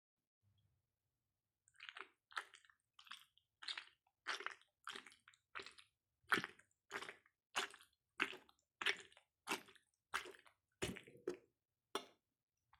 Sound effects > Objects / House appliances
LS - choking
Squishing a spoon into overcooked mac 'n' cheese in a bowl on the kitchen counter. Recorded on Honor 200 Smart.